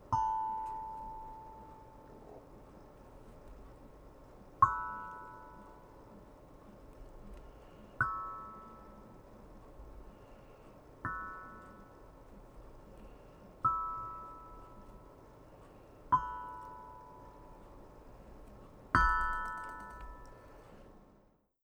Music > Solo percussion
MUSCTnprc-Blue Snowball Microphone, CU Steel Tongue Drum, Notes, Soft Nicholas Judy TDC
Soft steel tongue drum notes.